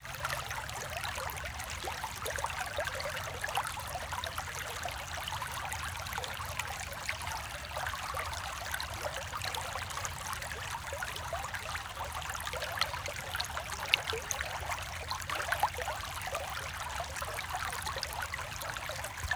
Soundscapes > Nature
r19 babbling brook
Field Recording. Babbling brook. North Georgia woods on rural/suburban property. Recorded on iPhone 11, version 16.2 using Voice Memos application. No additional microphones or buffers or anything used in recording. No edits or modifications. Pure nature.